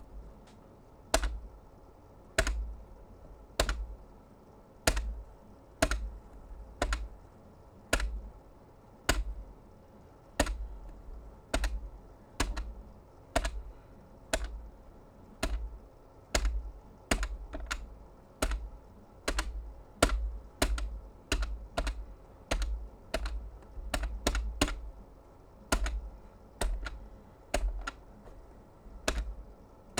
Sound effects > Objects / House appliances
COMTelph-Blue Snowball Microphone, CU Landline Pushbutton, Buttons Pushed Nicholas Judy TDC
Buttons being pressed from a landline pushbutton telephone. Singles and multiples.
multiple, foley, Blue-Snowball, buttons, button, phone, landline-pushbutton-telephone, pushbutton, telephone, Blue-brand, landline, press, single